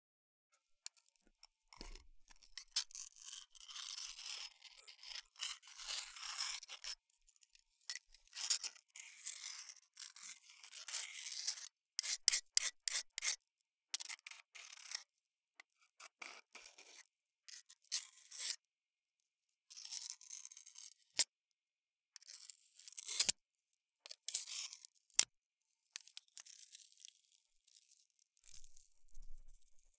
Sound effects > Objects / House appliances
Glass Shards - Scratching Ice
The sound of several small panes of glass scratching and grinding against one another. Could be used for characters interacting with glass, ice, statues, climbing a wall, or just carving something.
archeology, chipping, climbing, digging, freezing, frozen, glass, grind, grinding, ice, icy, mining, petrification, petrify, rock, sanding, scrape, scraping, scratch, scratching, scratchy, statue